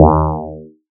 Instrument samples > Synths / Electronic
DUCKPLUCK 1 Eb
bass, additive-synthesis, fm-synthesis